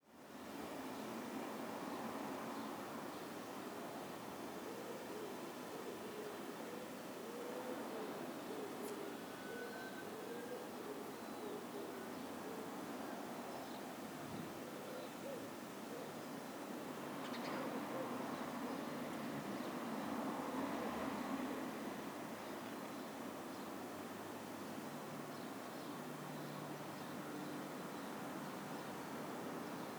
Soundscapes > Urban
Splott - Ambience Plane Cars Birds - Railway Street
fieldrecording, splott, wales